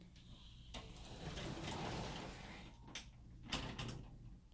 Sound effects > Objects / House appliances
Recorded a closing hotel closet door using my phone. This sound works best for manual doors rather than automatic doors, but it's your call.